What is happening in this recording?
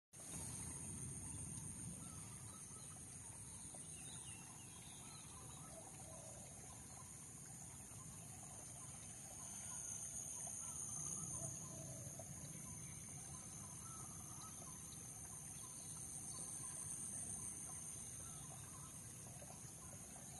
Soundscapes > Nature
Flowing water, chirping insect and/or frog sounds as well as some bird calls audible at a small rice field on Bali. Recorded Jan 11, 2025 in Ubud, Bali, Indonesia using a Moto G34, no editing.
animals, bali, bird, birds, chirp, chirping, flow, frogs, insects, nature, soundscape, ubud